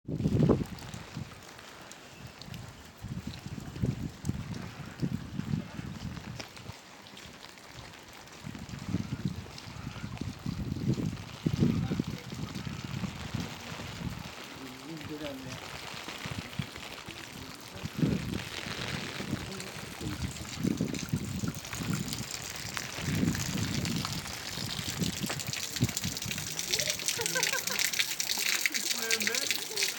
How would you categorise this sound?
Soundscapes > Nature